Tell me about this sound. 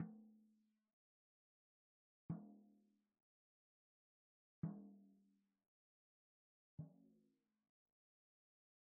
Music > Solo percussion
floor tom-tension hits 002 - 16 by 16 inch
acoustic, beat, beatloop, beats, drum, drumkit, drums, fill, flam, floortom, instrument, kit, oneshot, perc, percs, percussion, rim, rimshot, roll, studio, tom, tomdrum, toms, velocity